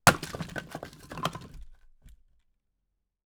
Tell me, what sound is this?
Sound effects > Natural elements and explosions
Subject : Pile of wood logs. Date YMD : 2025 04 22 Location : Inside a Barn Gergueil France. Hardware : Tascam FR-AV2, Rode NT5 in a XY configuration. Weather : Processing : Trimmed and Normalized in Audacity.